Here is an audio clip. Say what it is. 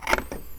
Sound effects > Other mechanisms, engines, machines
metal shop foley -102
bam, bang, boom, bop, crackle, foley, fx, knock, little, metal, oneshot, perc, percussion, pop, rustle, sfx, shop, sound, strike, thud, tink, tools, wood